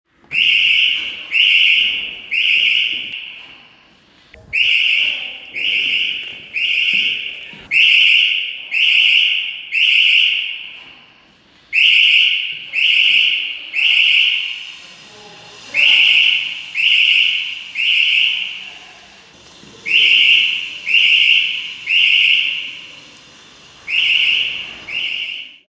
Sound effects > Other mechanisms, engines, machines
Alarm indoors 01
I recorded the smoke alarm inside my high school. High ceiling.
alarm, emergency, siren, smoke-detector, warning